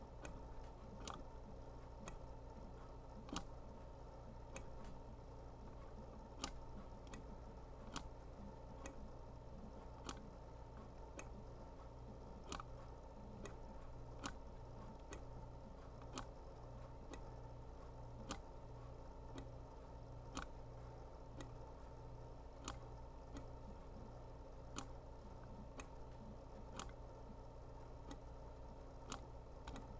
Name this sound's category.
Sound effects > Objects / House appliances